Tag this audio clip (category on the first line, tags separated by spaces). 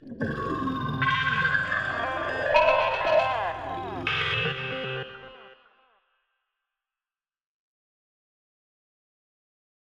Sound effects > Experimental
Reverberating Ominous Vocal Otherworldly gamedesign Animal Snarl Fantasy Alien Snarling scary fx Growl visceral Sounddesign Vox Sound sfx Creature Monstrous Groan Frightening evil boss Echo gutteral Monster devil Deep demon